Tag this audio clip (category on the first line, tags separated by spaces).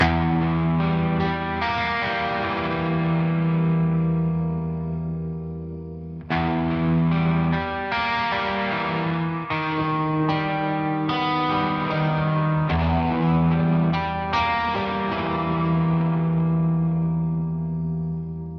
Music > Other
BM depressive electric guitar sample